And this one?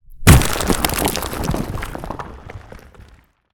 Sound effects > Other
Smashed/demolished brick wall crumbling/caving in
A brick wall being hit heavily, after which you can hear it crumbling and bricks rolling over the floor.